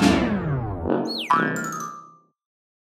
Sound effects > Electronic / Design
Comedic Sting (Cut The Music) 2
boing, boing-sound, comedic-hit, comedic-stab, comedy-boing, comedy-hit, comedy-stab, comedy-sting, funny-hit, funny-sound, funny-sting, jaw-harp, jew-harp, oops, silly, silly-hit, silly-sting, slapstick